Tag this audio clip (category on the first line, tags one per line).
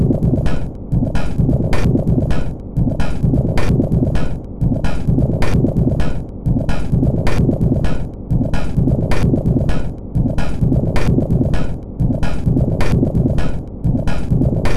Instrument samples > Percussion
Dark
Alien
Industrial
Underground
Weird
Soundtrack
Samples
Loop
Drum
Packs
Ambient
Loopable